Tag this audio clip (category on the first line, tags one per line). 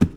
Sound effects > Objects / House appliances
container
pail
tip
garden
clatter
lid
water
clang
plastic
kitchen
cleaning
carry
tool
spill
bucket
debris
hollow
object
metal
fill
shake
scoop
household
liquid
foley
pour
drop
knock
slam
handle